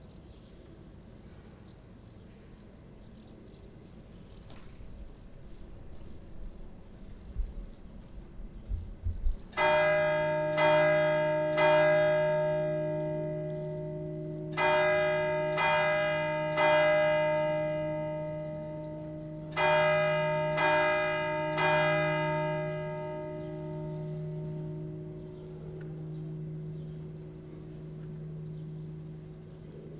Soundscapes > Urban
250629 08h05 Albi Church of St. Salvy - Bells - MKE600
Subject : Recording the Church of St. Salvy with a shotgun mic aiming for the bells. Date YMD : 2025 June 29 Morning 08h05 (probably like 08h04 and 50s or there about.) Location : Church of St. Salvy Albi 81000 Tarn Occitanie France. Sennheiser MKE600 with stock windcover P48, no filter. Weather : Sunny no wind/cloud. Processing : Trimmed in Audacity. Notes : There’s “Pause Guitare” being installed. So you may hear construction work in the background.